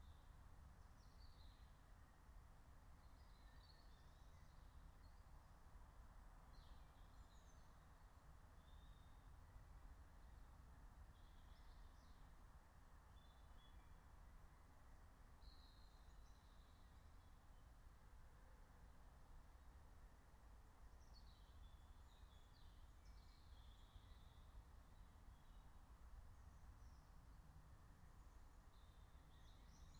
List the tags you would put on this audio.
Soundscapes > Nature
raspberry-pi soundscape

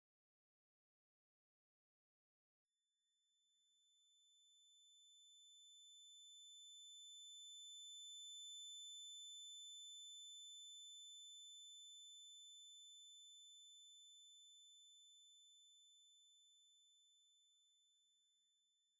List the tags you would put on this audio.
Sound effects > Electronic / Design
atmospheric earring earwhistle highfrequency highpitch horror ingingsound Long sounddesign soundeffect tinnitus

